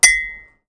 Sound effects > Objects / House appliances
FOODGware-Blue Snowball Microphone, CU Ceramic Mug Ding 03 Nicholas Judy TDC
A ceramic mug ding.
Blue-brand, Blue-Snowball, ceramic, ding, foley, mug